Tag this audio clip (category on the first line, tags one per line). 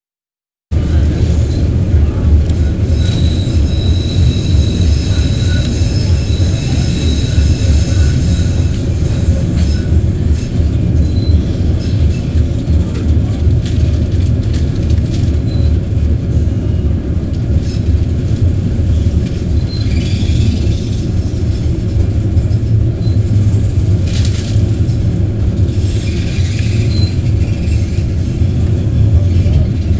Soundscapes > Urban
chatter
announcement
urbanlandscape
metro
publictransport
train
city
zoo
newcastle